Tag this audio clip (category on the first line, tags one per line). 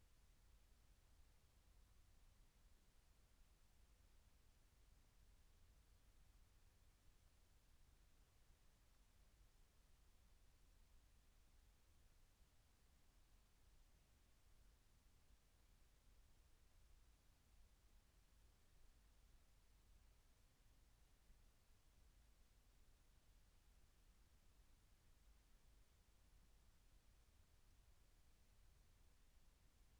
Soundscapes > Nature
nature
field-recording
raspberry-pi
alice-holt-forest
soundscape
phenological-recording
natural-soundscape
meadow